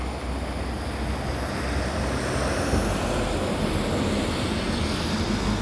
Sound effects > Vehicles
Sound of a bus passing by in Hervanta, Tampere. Recorded with a Samsung phone.